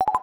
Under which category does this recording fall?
Sound effects > Electronic / Design